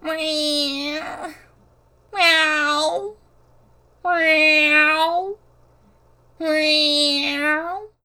Sound effects > Animals
TOONAnml-Blue Snowball Microphone, CU Cat, Meow, Human Imitation Nicholas Judy TDC
A cat meowing. Human imitation.
imitation, cat, meow, cartoon, Blue-brand, human, Blue-Snowball